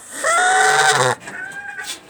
Sound effects > Animals
Waterfowl - Chinese Geese; Two Geese Sighing Close Perspective
Two Chinese geese sigh. These were sighing and hissing after a dog walked up on them.